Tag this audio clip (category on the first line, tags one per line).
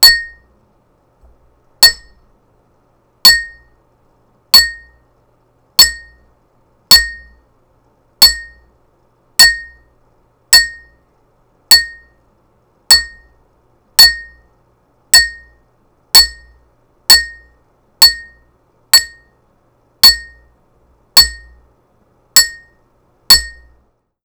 Sound effects > Objects / House appliances
foley
glass
single